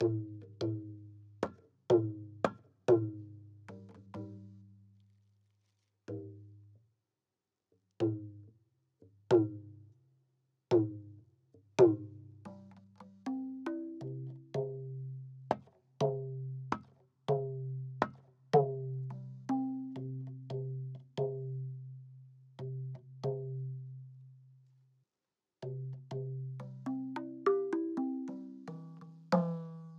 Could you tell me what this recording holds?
Instrument samples > Percussion
Tuning Burmese Drum - Recorded at MMA Home Studio
This is the sound of a traditional Burmese drum (called "Bohn") being tuned before a recording session. Captured in MMA Home Studio using Studio One DAW, Zoom LiveTrak L-12 mixer, and a Shure dynamic microphone. No effects added. Clean raw recording for sound design or cultural use. Gear Used: DAW: Studio One Mixer: Zoom LiveTrak L-12 Microphone: Shure Dynamic Mic Recorded at: MMA Home Studio, Myanmar
Burmese, Drum, Sample